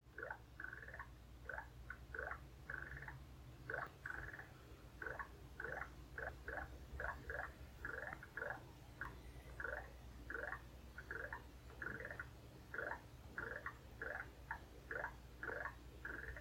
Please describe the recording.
Sound effects > Objects / House appliances
A very strange noise my fridge was making. Sounds like a metallic gurgling. Could be used as a machine sound, or it's also reminiscent of a frog.